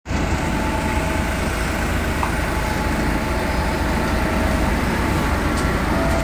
Soundscapes > Urban
Bus slowly moving 20
Where: Hervanta Keskus What: Sound of a bus slowly moving Where: At a bus stop in the evening in a cold and calm weather Method: Iphone 15 pro max voice recorder Purpose: Binary classification of sounds in an audio clip
bus-stop, traffic